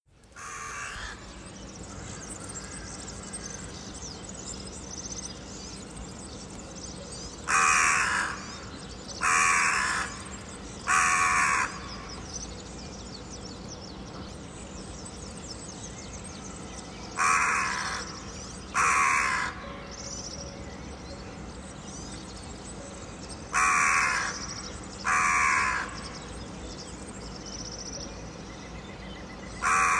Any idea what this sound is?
Sound effects > Animals
Crow & Morning birds
A crow, very close to me and early morning birds singing. A magpie at the end, quite far. * Field recording.
early, bird, raven, echo, nature